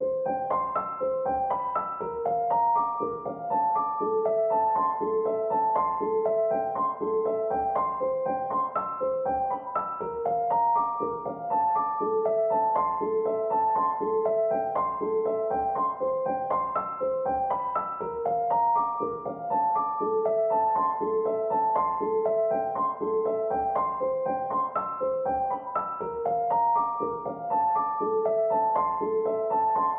Music > Solo instrument
Piano loops 196 octave up short loop 120 bpm
120, 120bpm, free, loop, music, piano, pianomusic, samples, simple, simplesamples